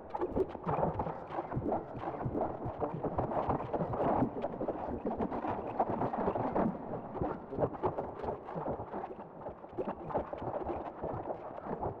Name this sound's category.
Sound effects > Electronic / Design